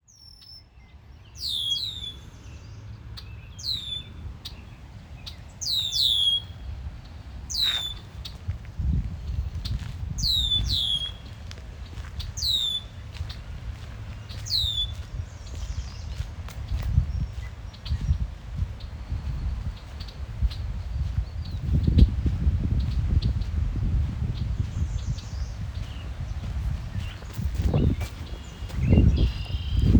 Soundscapes > Nature
birds and wind at lake erie shore, ohio, field recording
A brief recording of birds, wind, and lakeshore lappings on Lake Erie, at a muddy little access point near the Ohio/Michigan border, United States. Recorded with an iPhone on June 14, 2022.